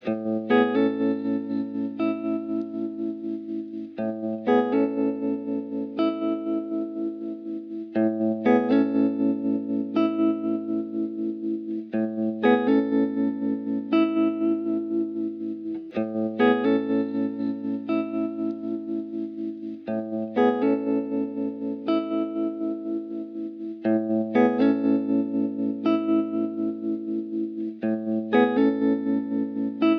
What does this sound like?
Music > Solo instrument
Guitar loops 126 07 verison 07 60.4 bpm
Guitar loop played on a cheap guitar. This sound can be combined with other sounds in the pack. Otherwise, it is well usable up to 4/4 60.4 bpm.